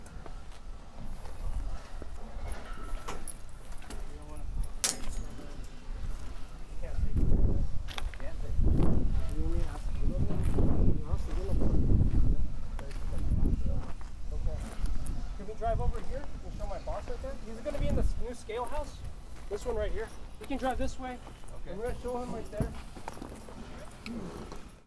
Sound effects > Objects / House appliances
Junkyard Foley and FX Percs (Metal, Clanks, Scrapes, Bangs, Scrap, and Machines) 196
Ambience, Atmosphere, Bang, Bash, Dump, dumping, Environment, garbage, Junk, Junkyard, Metal, Metallic, rattle, SFX, Smash, tube